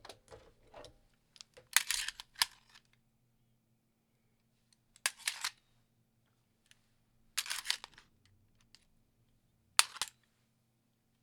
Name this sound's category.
Sound effects > Objects / House appliances